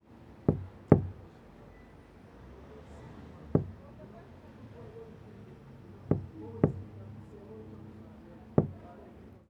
Soundscapes > Urban
Splott - Hollow Plastic Knocking Voices Cars - Splott Road
fieldrecording, splott